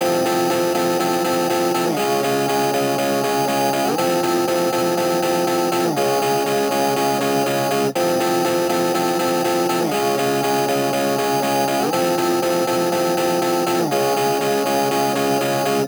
Music > Solo instrument
Otherwise, it is well usable up to 4/4 120.8 bpm.